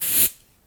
Sound effects > Objects / House appliances
Spraying a 300ml lighter fluid bottle while attempting to figure out how to refill a lighter, recorded with a Zoom H2n, MS mode. Normalized in Audacity.